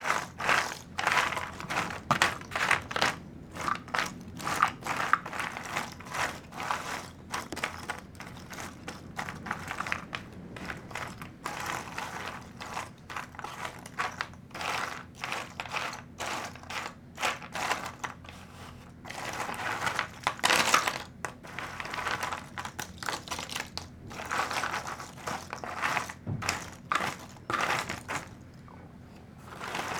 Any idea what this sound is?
Sound effects > Human sounds and actions
GAMEBoard Sorting through jigsaw puzzle pieces
Sorting through jigsaw puzzle pieces in a cardboard box by hand.
rustle, sort, sfx, jigsaw, rummage, sorting, puzzle, cardboard, hand, pieces